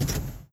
Sound effects > Vehicles
A fast parking brake set or release.